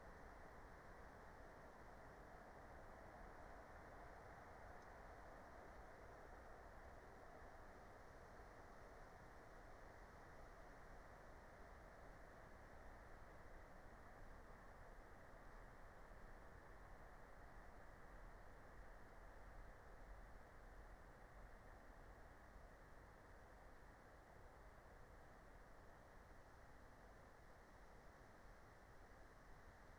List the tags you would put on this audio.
Soundscapes > Nature
field-recording phenological-recording raspberry-pi

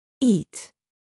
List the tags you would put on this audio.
Speech > Solo speech
word voice

